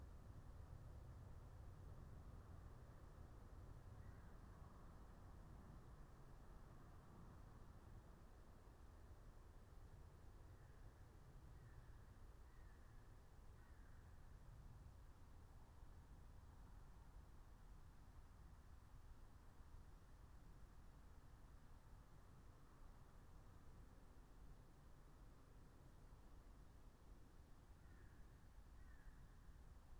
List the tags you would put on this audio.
Soundscapes > Nature
soundscape
raspberry-pi
natural-soundscape
phenological-recording
alice-holt-forest

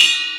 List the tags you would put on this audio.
Instrument samples > Percussion
bassbell
bell
bellcup
bellride
click-crash
crashcup
cup
cupride
cymbal
cymbell
Istanbul
Istanbul-Agop
Meinl
metal-cup
Paiste
ping
ride
ridebell
Sabian
Zildjian

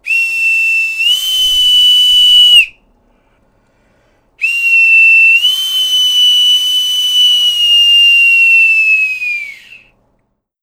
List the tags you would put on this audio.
Sound effects > Objects / House appliances
Blue-brand
whistle
pipe
bosun
chow-down
Blue-Snowball
boatswains-call

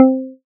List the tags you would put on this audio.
Instrument samples > Synths / Electronic
additive-synthesis
fm-synthesis
pluck

